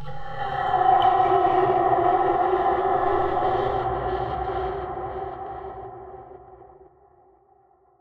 Sound effects > Experimental
Creature Monster Alien Vocal FX-9
Deep, visceral, Fantasy, Sounddesign, Vocal, Monster, Snarl, Groan, Animal, sfx, scary, Creature, Snarling, gamedesign, Monstrous, demon, Ominous, Growl, devil, Alien, Frightening, Reverberating, Sound, fx, Echo, Otherworldly, Vox, boss, gutteral, evil